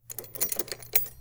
Sound effects > Other mechanisms, engines, machines
Woodshop Foley-062
foley, metal, perc, tools, oneshot, bang, tink, thud, pop, rustle, wood, sound, fx, percussion, boom, crackle, strike, bam, shop, sfx, bop, little, knock